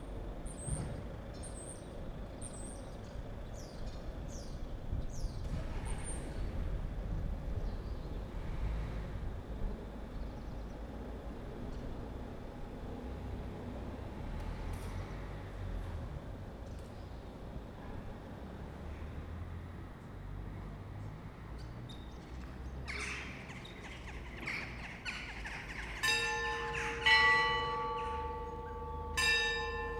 Soundscapes > Urban
Clocher Tour de L'Horloge MS - 2025 04 02 08h00 Rivesaltes
Subject : The bells in Rivesaltes, Date YMD : 2025 04 02 08h00 Location : 1 Rue du 4 Septembre Rivesaltes 66600 Pyrénées-Orientales, Occitanie, France. Hardware : Zoom H2N MS RAW mode. (I think I converted this recording in to MS stereo) Weather : Cloudy, with little to no wind. Processing : Trimmed and Normalized in Audacity. Notes : There's a street sweeper at the end there.